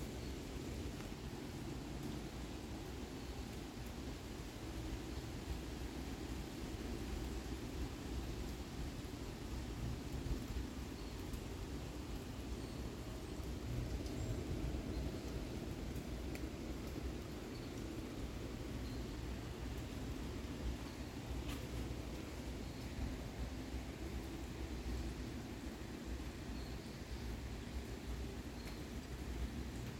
Soundscapes > Nature
Heavy rain in distance.